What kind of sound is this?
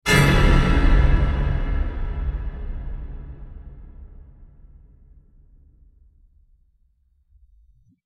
Sound effects > Other
Orchestral Shock Cut Hit 1
Shock-cut orchestral hits for your scary moments, created with orchestral music and synths. Generated by the AI site, Elevenlabs.